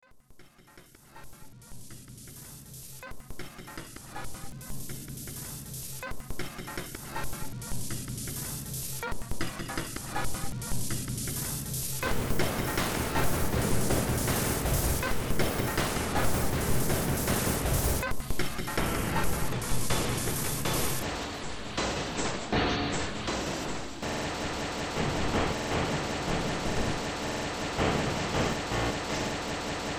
Music > Multiple instruments
Demo Track #3354 (Industraumatic)
Underground, Ambient, Industrial